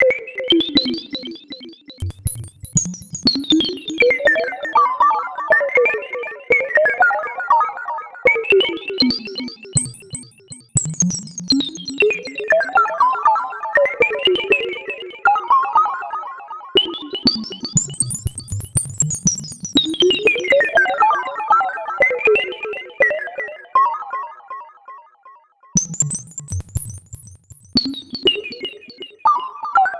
Soundscapes > Synthetic / Artificial
Space Glitches 001
Glitch space sound 001 Developed using Digitakt 2 and FM synthesis
glitch, space